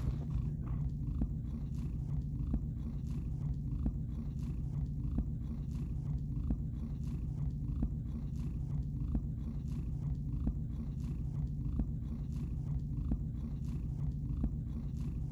Sound effects > Animals
A cat purring. Looped.